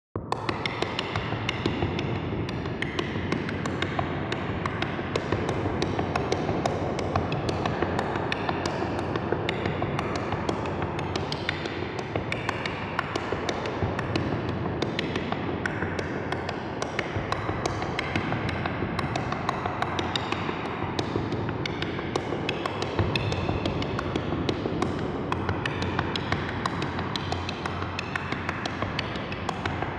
Music > Solo percussion
Perc Loop-Huge Reverb Percussions Loop 1
I was synthed a chord sound with vital, than I dragged it into phaseplant granular. Processed with Khs Filter Table, Khs convolver, ZL EQ, Fruity Limiter. (Celebrate with me! I bought Khs Filter Table and Khs convolver finally!)
Ambient, Cave, Cinematic, Loop, Percussion, reverb, Underground